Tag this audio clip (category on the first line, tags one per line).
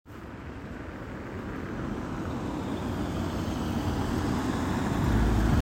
Sound effects > Vehicles
tampere
car
field-recording